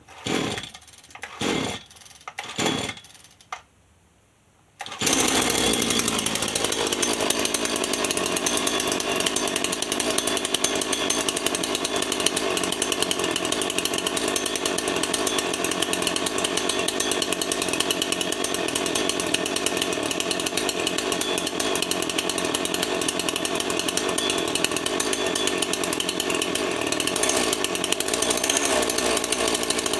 Sound effects > Other mechanisms, engines, machines
Worn-out two-stroke chainsaw starting, idling and free-revving. (LQ VERSION)
An old-ish two-stroke chainsaw starting, free-revving, idling, etc. The sound came out bad in my opinion, i did not realise the muffler was pointing at the microphone and so each combustion caused audio dropouts. I can not re-record the saw as while troubleshooting it after recording the connecting rod gave out. This is the UNPROCESSED, LQ version. There is also a processed version.
chainsaw, engine, idle, motor, rev, saw, start, two-cycle, two-stroke, twostroke